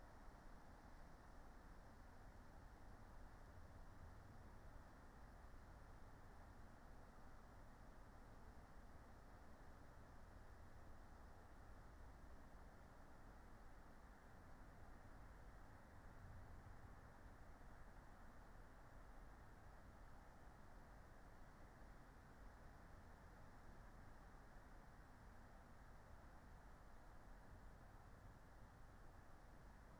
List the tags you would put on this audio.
Soundscapes > Nature
field-recording
data-to-sound
soundscape
modified-soundscape
nature
weather-data
natural-soundscape
alice-holt-forest
raspberry-pi
sound-installation
phenological-recording
artistic-intervention
Dendrophone

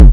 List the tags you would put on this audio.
Instrument samples > Percussion
Kick
Sub
Subsive

